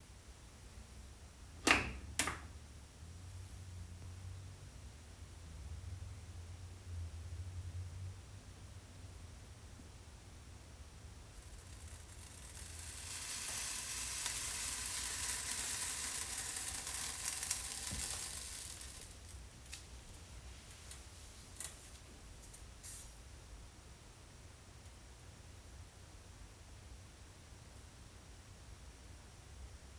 Sound effects > Objects / House appliances
The sound of my teapot heating up--includes sizzle in the beginning from something that fell in the burner.